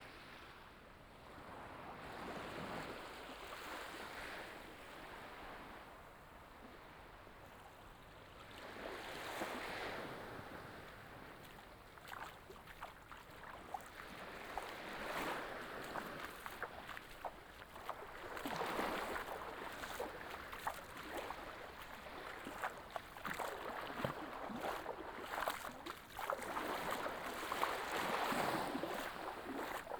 Soundscapes > Nature
Calm Sea Waves3 - Japan - Binaural
Recorded waves on a beach in a small quiet town in Japan. Recorded with: Zoom H5 Soundman OKM2 Classic